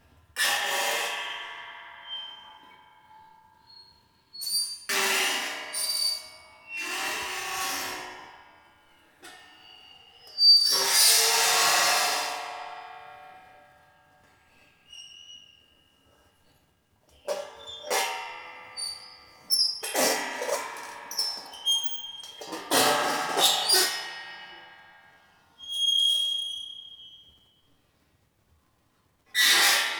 Instrument samples > Percussion
drum Scratch STE-000
drum skrech in the studio recorded in zoom h4n